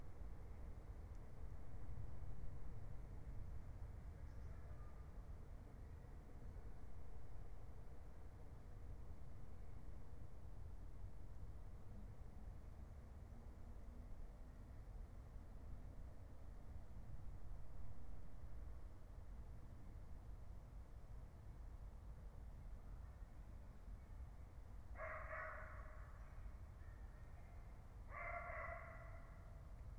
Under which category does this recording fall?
Soundscapes > Nature